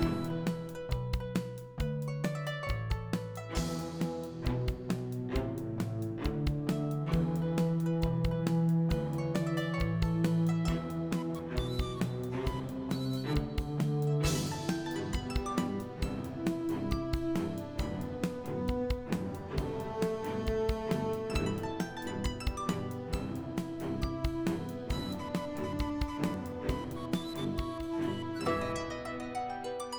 Music > Multiple instruments
"First Battle" Fantasy Combat Background Music Loop 135bpm in E Minor
A Track composed to serve as a background music for battle scenes in video games, specifically turn based rpgs.
135bpm, background, brass, cinematic, drums, film, flute, gaming, harp, intense, movie, orchestral, plucked, strings, upbeat